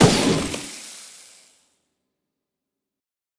Sound effects > Electronic / Design
Enemy Death (Gaseous) #1
Meant for a gas-filled enemy like a spore-producing mushroom or toxic hovering sphere, but you could use it for other stuff.